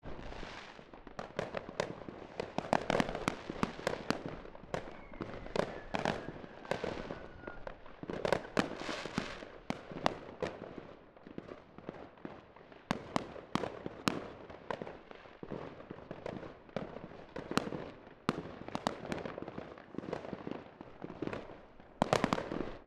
Sound effects > Natural elements and explosions
Fireworks Full Peak Multiple Blasts With Whistler And Crackling Fall
Stereo field recording of distant and near fireworks at the beginning of a New Year’s Eve celebration. Individual explosions with natural spacing, recorded outdoors with wide stereo image. Suitable for film, game ambience, documentaries and sound design. Recorded using a stereo A/B setup with a matched pair of RØDE NT5 microphones fitted with NT45-O omni capsules, connected to an RME Babyface interface. Raw field recording with no post-processing (no EQ, compression or limiting applied).
stereo, atmosphere, explosions, eve, recording, bang, distant, celebration, sfx, bangs, near, new, firework, field, years, year, ambience, sound, fireworks, effect, outdoor, explosion